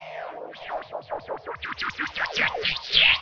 Soundscapes > Synthetic / Artificial
LFO Birdsong 3
massive, lfo, bird